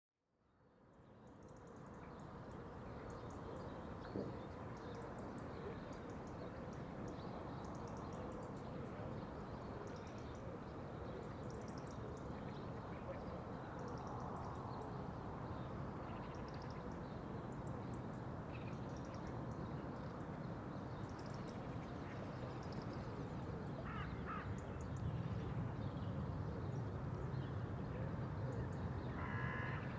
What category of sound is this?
Soundscapes > Urban